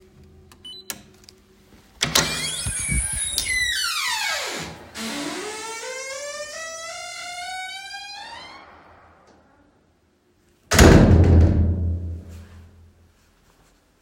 Sound effects > Other
Cell/Vault door open, creak and slam
This is a field recording of a heavy, armoured door opening and closing in a police custody centre somewhere in the North of England. The first 'bleep' is the sound of the access card triggering the lock, followed by the creak of opening, the creak of the door closing on the shutter before the reverberant 'slam' of the door itself closing. Recorded using the iPhone voice memo app with the stock microphone, in a 3x5m concrete room.
carceral-systems, dungeon